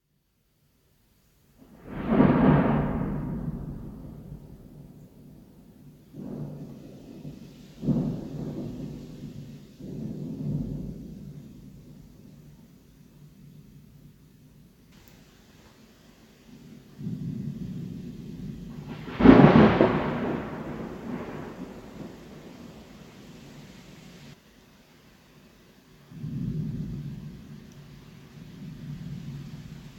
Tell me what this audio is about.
Sound effects > Natural elements and explosions
Thunder at night 02
lightning, wind, thunder, nature, storm, rain, thunder-storm, rainstorm, thunderstorm, weather